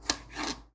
Sound effects > Objects / House appliances
FOODIngr-Samsung Galaxy Smartphone, CU Petite Cherry Snacking Tomato Being Cut 02 Nicholas Judy TDC
A petite cherry snacking tomato being cut.
Phone-recording, foley, petite-cherry-snacking-tomato, cut